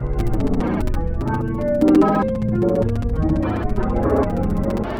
Music > Other
Unpiano Sounds 015
Distorted, Piano, Distorted-Piano